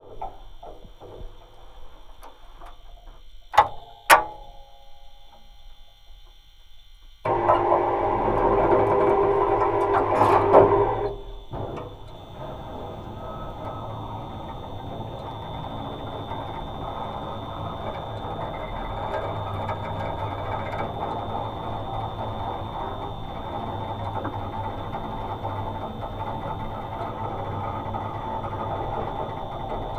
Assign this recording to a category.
Sound effects > Other mechanisms, engines, machines